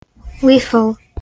Speech > Solo speech
Girl Says Lethal
Girl Says "Lethal" Not edited or processed 100% natural no artifcial robots this is my real irl voice
lethal, speak, voice, english, female